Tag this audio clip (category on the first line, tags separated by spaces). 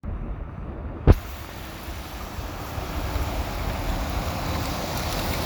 Soundscapes > Urban
bus passing